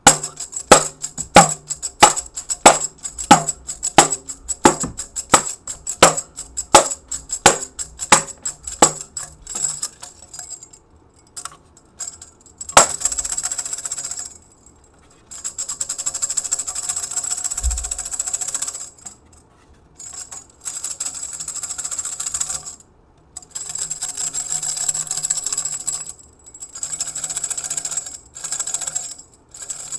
Music > Solo percussion
Tambourine rhythms, shakes and hits.